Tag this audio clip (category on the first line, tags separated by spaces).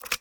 Sound effects > Electronic / Design
game interface